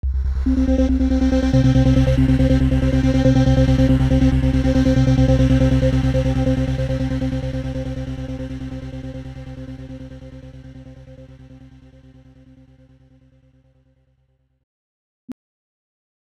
Instrument samples > Synths / Electronic
Deep Pads and Ambient Tones1
Synthesizer, Pads, bassy, Chill, Digital, Note, bass, Dark, Oneshot, Tones, Deep, Haunting, Pad, Ambient, synthetic, Analog, Ominous, Tone, Synth